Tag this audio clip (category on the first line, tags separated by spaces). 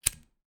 Sound effects > Objects / House appliances
lighter light striking